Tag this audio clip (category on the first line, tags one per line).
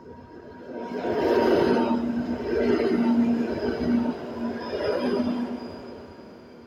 Sound effects > Vehicles
vehicle
transportation
tramway
tram